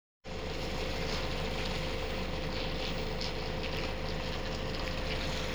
Sound effects > Other mechanisms, engines, machines
clip auto (20)
Auto, Avensis, Toyota